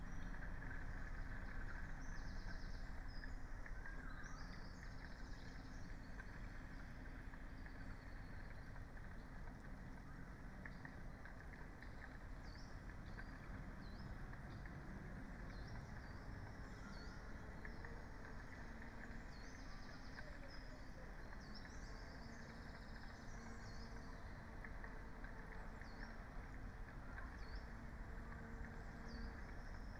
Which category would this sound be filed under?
Soundscapes > Nature